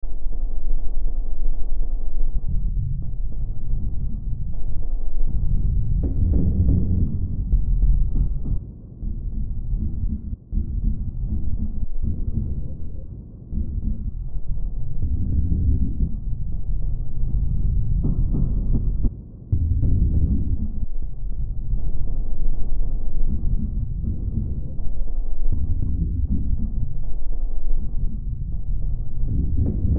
Soundscapes > Synthetic / Artificial
Looppelganger #183 | Dark Ambient Sound
Use this as background to some creepy or horror content.